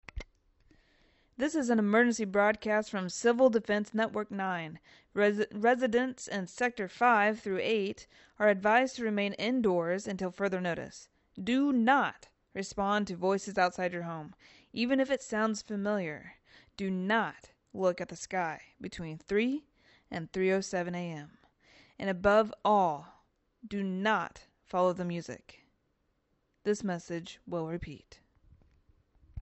Speech > Solo speech
“Emergency Broadcast: Zone Alert” (radio emergency / dystopian / analog horror)
A chilling public safety announcement that hints at something unseen and terrifying—great for horror soundscapes, ARGs, or narrative tension.